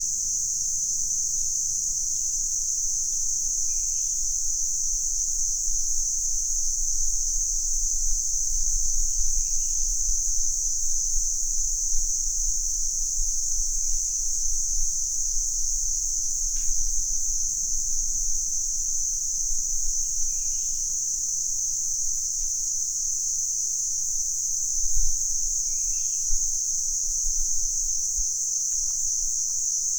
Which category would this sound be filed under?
Soundscapes > Nature